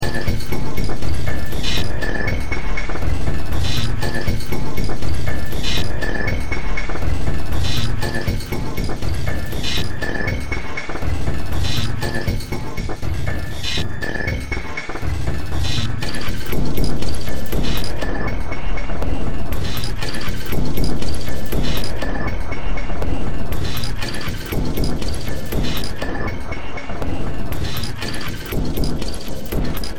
Music > Multiple instruments
Demo Track #3600 (Industraumatic)
Cyberpunk, Horror, Ambient, Underground, Sci-fi, Industrial